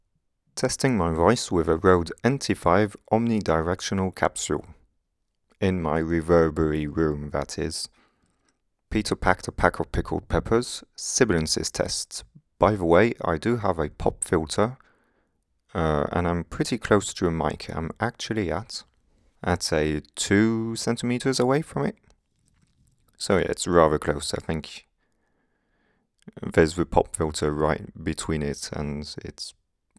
Speech > Solo speech
250728 Rode NT5-O Speech test
Subject : Testing 3 microphones in my reverbery room (Sennheiser MKE-600 , NT5 cardioid and omni capsule). At night with my house-mate sleeping and maybe a little ill so my voice isn't probably in it's best shape but tada. Date YMD : 2025 July 28 Location : Albi France. Rode NT5 with a omni capsule with a pop filter. Weather : Processing : Trimmed and normalised in Audacity. Notes : Speaking in a corner, into a clothes closet (to minimise the reverb by absorbing it with the clothes and shield it from the room via the doors.).
gear-testing, hardware-testing, Male, microphone-test, nt5-o, Omni, pop-filter, rode, test, voice